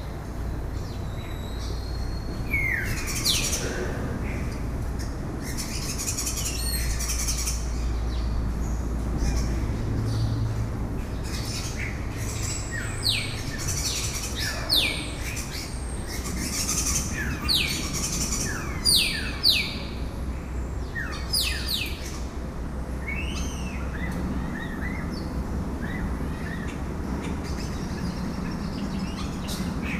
Soundscapes > Nature
Starlings chattering in a metal shed
A field recording of starlings singing in a metal-framed open-walled building. Recorded in Fort Walton Beach, Florida on 17th September 2010 on an Edirol R-09 field recorder.
chattering, shed, bird, reverb, starlings, wildlife, singing, nature